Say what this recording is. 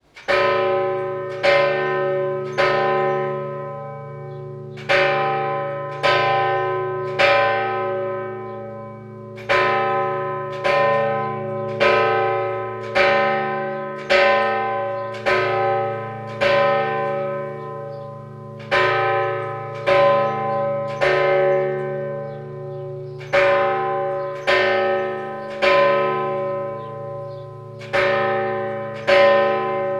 Sound effects > Other
Greek Orthodox Church bell call to 6pm vesper
Recorded 20 meters away from Our Lady Evangelistria of Tinos belfry. The hammer action can be heard before each strike. Recorded using the Zoom H2essential recorder.
exterior, Orthodox, Bells, Vesper, Greek, Ring, Cathedral, Chime, Bell, Church, Call